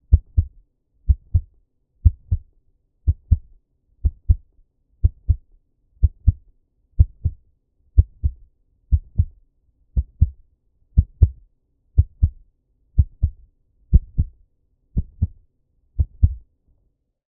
Sound effects > Human sounds and actions
Foley Heartbeat
A heartbeat I recorded by pinching my tshirt in two places and pulling it apart in the rhythm of a heartbeat. I filtered out frequencies above 700Hz to finalise the sound.
foley, heartbeat